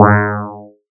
Instrument samples > Synths / Electronic
DUCKPLUCK 1 Ab
additive-synthesis, bass, fm-synthesis